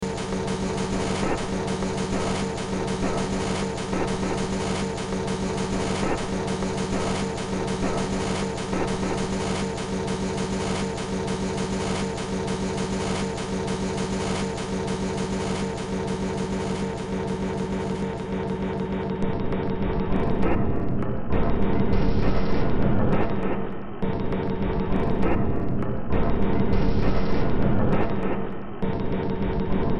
Music > Multiple instruments
Demo Track #3276 (Industraumatic)
Horror, Soundtrack, Games, Sci-fi, Noise, Underground, Cyberpunk, Ambient, Industrial